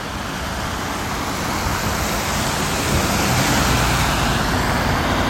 Soundscapes > Urban
City bus engine and passenger transport vehicle. Low-frequency diesel engine rumble with intermittent acceleration. Air-brake hiss during stopping, subtle vibrations from the chassis, tire noise rolling over asphalt. Occasional mechanical rattling and distant urban ambience such as traffic and wind. Recorded on iPhone 15 in Tampere. Recorded on iPhone 15 outdoors at a city bus stop on a busy urban street, close to the curb as the bus arrived, idled, and departed. Used for study project purposes.
vehicle, bus, transportation